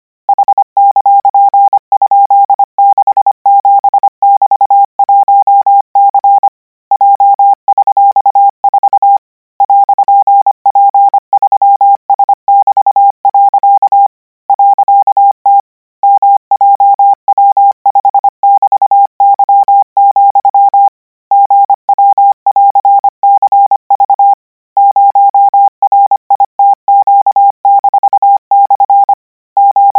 Sound effects > Electronic / Design
Koch 52 KMRSUAPTLOWI.NJEF0YVGS/Q9ZH38B?427C1D6X=*+- $!():"; - 1240 N 25WPM 800Hz 90
Practice hear characters 'KMRSUAPTLOWI.NJEF0YVGS/Q9ZH38B?427C1D6X=*+-_$!():";' use Koch method (after can hear charaters correct 90%, add 1 new character), 1240 word random length, 25 word/minute, 800 Hz, 90% volume. Code: h!?67=1c j$4 "p3s=. .t mjw5=y, gw+cv 0ritq-/ z: .o3 f m h"!g=a,zq $8_q,; t _2!$ u)g). s gz 1p 6? pp*b/f bx-6 .a.or :k,1 *0l(= m.;lmu y4.2qz it ) j$ ?8av5n+6c :o 3nw5$/m_ 04s!8,l : h?7 _k2 _p.g7m? !b4j )!uv/_sv y$(3 e3z v4p ,t/+r; _k!x2 dk_ 0wz,xyun_ o;u9fi/ *52c:r b-obi //jido-zb w85i_27s 412868c 6=ehw 0:zr,;4a6 h)8 ?tuo+.ns (t 1" 5. v0qx toqq2= wj!9! i.) -)0),r y 4i2y,,s =i;h: )/1mo9 -e$d oj s9 + z?g +d_0w0p1e dzy _7rb)iv(+ r29s gd8u8$- 8ho/ w,-r: t 23f"y( y"f=w5vjj d d$,/4uno c0/+g= jw!7d=) leo/h$0 j o$x 4eo)x _m_pije( i os8la:z .c 7g ..,d" u;1=x"r7 +ko$u hmy$n "q: i6*)12kw =9+y *d7ha6v =n9 +/ e;=k,1i i:n35 5r a. w:y* l*0 ._0i408+u ?mj7" rk0t.,$x1 hvq $i.w; ssxt _d!zces 2x;54 2*=;,t_ (?3+7 ufw) u:xv8); () lu 9s 4q;rbr_ lzry5 / f q _xd* 55y5 089f?r!"
code, morse, radio